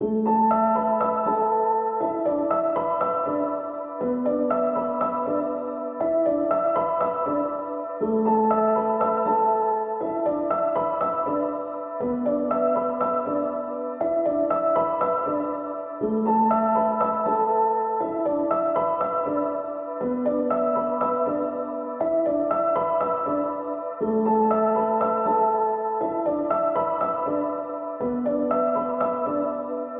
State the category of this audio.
Music > Solo instrument